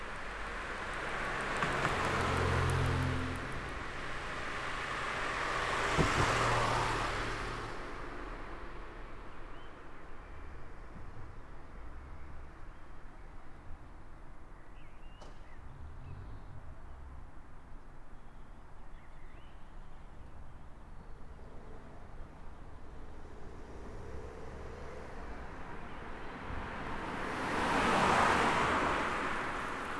Soundscapes > Urban
AMBSubn Residential intersection with traffic, also dogs and doves, Nyborg, Denmark
Recorded 10:12 14/07/25 Facing the center of a 4-way intersection in a neighbourhood with brick houses. Cars and a noisy truck pass, but no people since it’s in the morning. A dog barks in the beginning. Collared and wood pigeons call, blue tits and blackbirds sing. Zoom H5 recorder, track length cut otherwise unedited.
Barking, Distant, Morning, Passing, Traffic